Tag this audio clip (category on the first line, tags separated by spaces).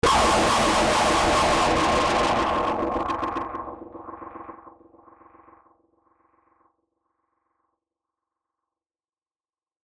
Sound effects > Experimental
distorted electronic symth